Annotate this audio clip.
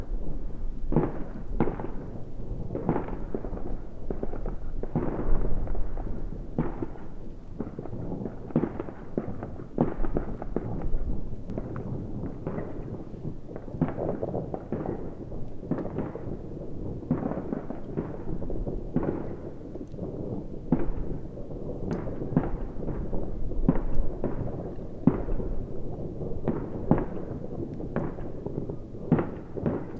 Soundscapes > Synthetic / Artificial
Ambience City DistantFireworks Part2
ambient, atmosphere, background, cozy, crackle, field-recording, fire, nature, soundscape, stove, warm